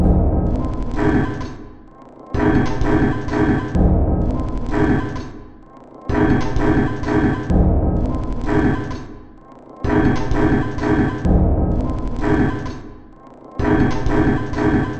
Instrument samples > Percussion
This 128bpm Drum Loop is good for composing Industrial/Electronic/Ambient songs or using as soundtrack to a sci-fi/suspense/horror indie game or short film.
Drum, Dark, Ambient, Underground, Alien, Loopable, Samples, Packs, Weird, Loop, Industrial, Soundtrack